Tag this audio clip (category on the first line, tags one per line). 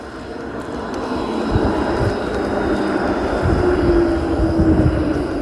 Soundscapes > Urban
vehicle
tram
tampere